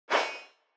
Sound effects > Other
Sword Swing 3
Sword swing effect created by combining a knife recording and a swing sound I designed with a synth. Both are combined with processing. SFX created for a game jam submission.
hit, knife, ataque, blade, cut, slash, espada, battle, metal, sword, sharp, attack, weapon, swing, woosh